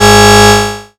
Instrument samples > Synths / Electronic
DRILLBASS 1 Ab
additive-synthesis, bass, fm-synthesis